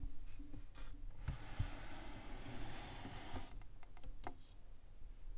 Sound effects > Objects / House appliances
Wooden Drawer 01
open, dresser, drawer